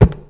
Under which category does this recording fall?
Sound effects > Objects / House appliances